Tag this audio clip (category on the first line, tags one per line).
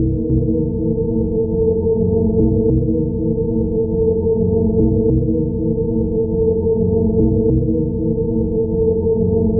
Instrument samples > Percussion
Dark
Drum
Loopable